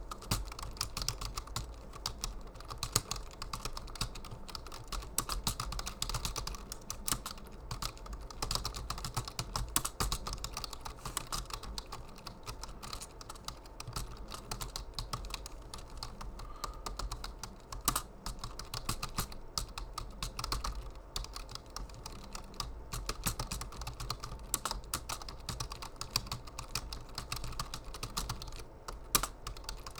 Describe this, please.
Sound effects > Objects / House appliances
CMPTKey-Blue Snowball Microphone, CU Typing Nicholas Judy TDC
Typing on a keyboard.
Blue-Snowball, Blue-brand, type, foley, keyboard